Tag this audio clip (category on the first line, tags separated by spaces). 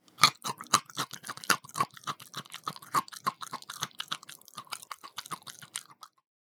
Sound effects > Other
bag bite bites crunch crunchy design effects foley food handling macadamia nuts plastic postproduction recording rustle SFX snack sound texture